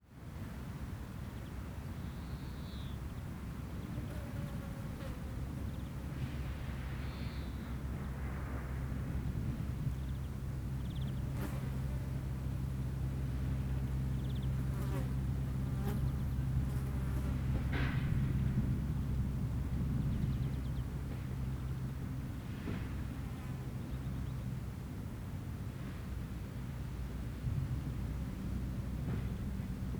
Soundscapes > Urban
Splott - Distant Industry Flies Rumbles - Splott Beach
wales, fieldrecording, splott